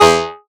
Instrument samples > Synths / Electronic
TAXXONLEAD 2 Ab
bass, additive-synthesis, fm-synthesis